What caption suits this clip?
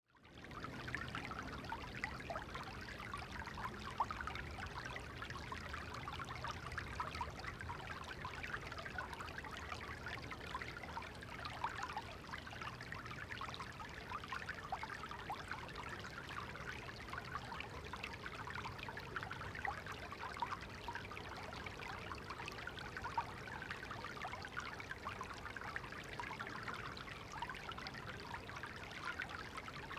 Soundscapes > Nature
A quick recording of water flowing through a small stream at Cannock Chase, Staffordshire. Distant traffic hum in the background. Zoom H6 Studio. XY Mics.
flowing, outdoor, flow, field-recording, nature, stream, water